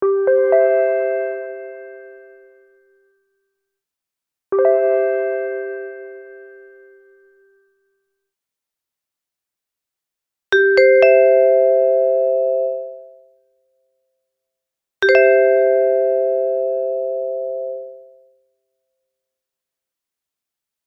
Sound effects > Human sounds and actions
Call Signal Announ
A short, pleasant tone chime commonly heard in airports, supermarkets, and train stations to grab attention before a public announcement. The sound is friendly and clear, often described as a 'ding-dong' or 'beep-boop' sequence, designed to signal listeners without startling them. Perfect for use in public address systems, informational messages, or any project needing an authentic pre-announcement alert tone.
supermarket, attention, airport, PA, call, announcement, beep, tone